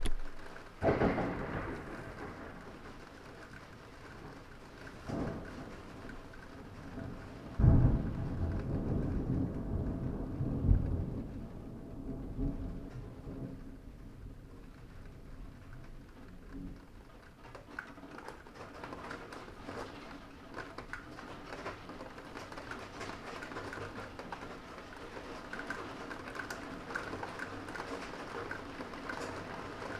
Soundscapes > Indoors
Thunder and Rain Indoors
Thunder then rain in an attic room. Recorded with Zoom H1n.